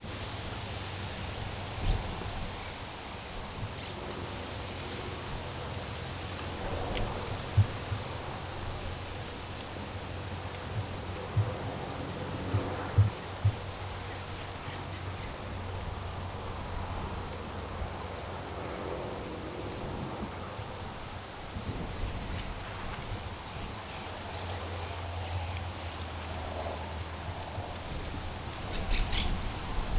Soundscapes > Nature
countryside-day-breeze and birds 2
ambiance recorded in a farm near a route. Very few vehicles passing by.